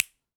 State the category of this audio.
Sound effects > Human sounds and actions